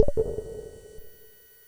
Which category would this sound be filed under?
Instrument samples > Percussion